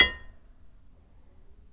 Sound effects > Other mechanisms, engines, machines
metal shop foley -042
bam, bang, boom, bop, crackle, foley, fx, knock, little, metal, oneshot, perc, percussion, pop, rustle, sfx, shop, sound, strike, thud, tink, tools, wood